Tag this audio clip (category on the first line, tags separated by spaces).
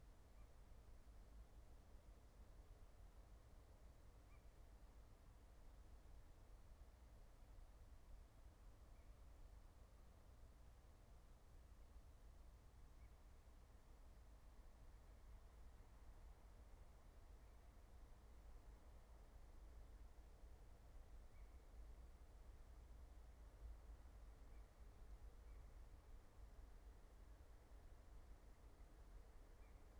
Soundscapes > Nature
nature phenological-recording meadow